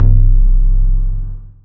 Instrument samples > Synths / Electronic
CVLT BASS 158
wobble, subbass, stabs, drops, clear, lowend, synthbass, bass, lfo, wavetable, low, subwoofer, sub, synth, subs, bassdrop